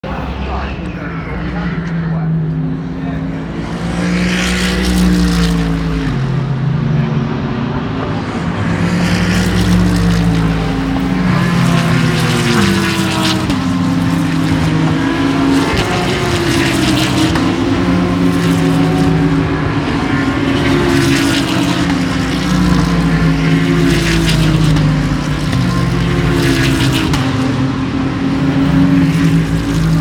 Soundscapes > Urban
2024 GT3/4 races at brands hatch. Loud supercars constantly passing by. Commentators faintly heard.